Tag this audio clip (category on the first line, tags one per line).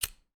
Sound effects > Objects / House appliances
flame
light
lighter
striking